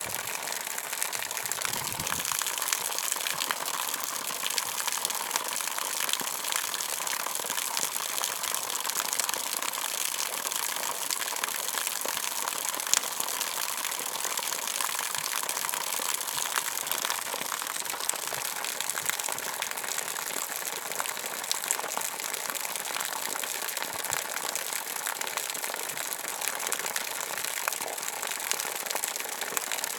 Soundscapes > Nature
Strong Brunz Stream Waterfall
creek, river, stream, Water, waterstream